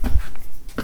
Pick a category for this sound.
Sound effects > Other mechanisms, engines, machines